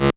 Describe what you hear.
Sound effects > Experimental
Analog Bass, Sweeps, and FX-018
oneshot, pad, trippy, sci-fi, dark, weird, bassy, sample, fx, complex, effect, alien, bass, electronic, robot, mechanical, sfx, scifi, synth, analog